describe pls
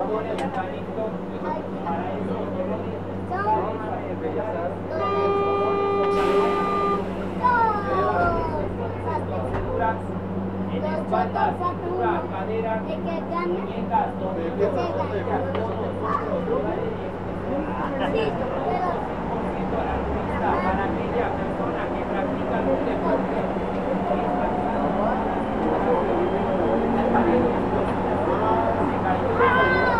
Soundscapes > Urban
Subway CDMX People Talking Doors
I took a trip on the Subway at Mexico City, there's a nearby kid talking and a few people in the background. You can hear the train going from one stop to another, and the door alarm.
subway, Child, People